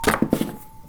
Sound effects > Other mechanisms, engines, machines
Handsaw Oneshot Hit Stab Metal Foley 1
twangy, tool, metallic, foley, smack, household, percussion, vibe, plank, sfx, saw, twang, handsaw, perc, shop, fx, hit, metal, vibration